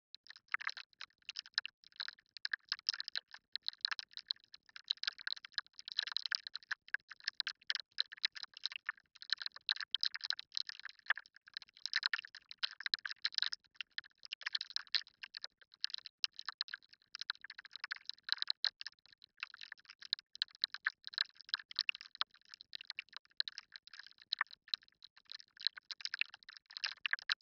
Sound effects > Electronic / Design
ROS-Saliva Texture1
All samples used from: TOUCH-LOOPS-VINTAGE-DRUM-KIT-BANDLAB. Processed with KHS Filter Table, KHS Convolver, Vocodex, ZL EQ and Fruity Limiter.
Botanica, Effect, Organic